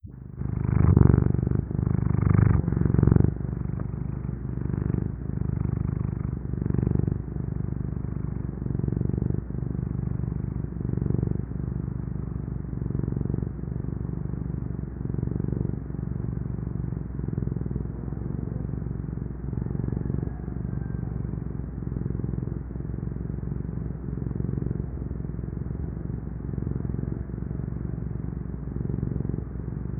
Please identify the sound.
Sound effects > Animals
ronroneo (purr)
recording a purr, female cat
purr, cat-sounds